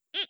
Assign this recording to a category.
Sound effects > Electronic / Design